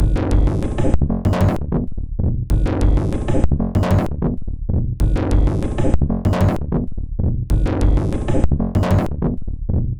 Instrument samples > Percussion
This 192bpm Drum Loop is good for composing Industrial/Electronic/Ambient songs or using as soundtrack to a sci-fi/suspense/horror indie game or short film.
Packs, Industrial, Dark, Weird, Ambient, Alien, Loop, Soundtrack, Samples, Drum, Loopable, Underground